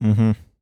Speech > Solo speech
Date YMD : 2025 July 29 Location : Indoors France. Inside a "DIY sound booth" which is just a blanket fort with blankets and micstands. Sennheiser MKE600 P48, no HPF. A pop filter. Speaking roughly 3cm to the tip of the microphone. Weather : Processing : Trimmed and normalised in Audacity.
Sennheiser, Single-mic-mono, unhun, Adult, humm, Male, mid-20s, Calm, Hypercardioid, Shotgun-microphone, MKE600, Tascam, Generic-lines, 2025, VA, MKE-600, Shotgun-mic, FR-AV2, july, Voice-acting, un-hum